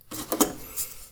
Sound effects > Other mechanisms, engines, machines
Dewalt 12 inch Chop Saw foley-043
Foley, Chopsaw, Perc, Workshop, Percussion, Teeth, Blade, Tools, Saw, Tooth, Scrape, Woodshop, SFX, Metal, Metallic, Circularsaw, Shop, Tool, FX